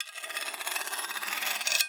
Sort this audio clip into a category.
Sound effects > Objects / House appliances